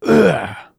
Speech > Solo speech
Ugh Ouch Pain Male Voice
This kinda hurt... his feelings Male vocal recorded using Shure SM7B → Triton FetHead → UR22C → Audacity → RX → Audacity.
disgust, disgusting, eww, hurt, interjection, male, masculine, pain, shouting, vocal, yuck